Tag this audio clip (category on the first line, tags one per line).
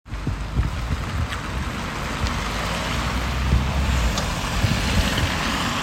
Sound effects > Vehicles
automobile
outside
car
vehicle